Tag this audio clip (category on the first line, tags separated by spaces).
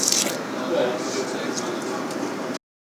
Sound effects > Human sounds and actions
crush Vox talking rev vocal distant receipt people squish scrunch